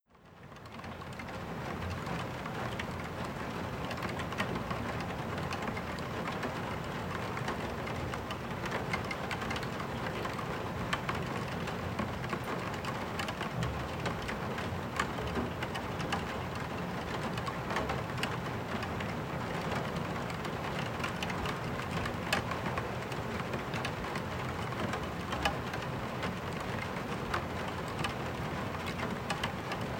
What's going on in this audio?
Soundscapes > Indoors
Interior Rain
Rain hits the skylight in my bedroom. There are two textures: first, the microphone is pointed toward the window, then toward the wall, which produces a muffled sound. * No background noise. * No reverb nor echo. * Clean sound, close range. Recorded with Iphone or Thomann micro t.bone SC 420.